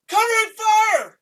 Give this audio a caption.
Speech > Solo speech
Soldier Covering Fire
Soldier Yelling a Command
agression, army, attack, battle, military, war